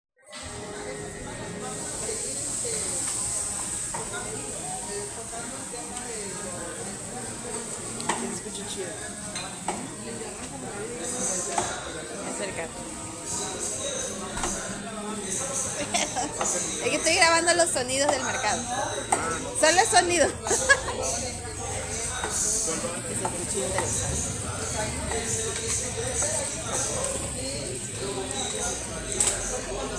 Soundscapes > Urban
Esta caminata dentro del Mercado de la Sierra, un mercado con mucha historia que surge en 1970. Sonidos típicos de un día común de la semana. Se escuchan sonidos de tacos, taquerías, taqueros, licuados, personas conversando tanto adultos como niños jugando. Audio realizado por Dalia Velazquez. This walk through the Mercado de la Sierra, a market steeped in history that opened in 1970. Typical sounds of an ordinary weekday. You can hear the sounds of tacos, taquerias, taqueros, smoothies, and people chatting, both adults and children playing. Audio by Dalia Velazquez